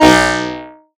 Instrument samples > Synths / Electronic
TAXXONLEAD 1 Eb
bass, additive-synthesis, fm-synthesis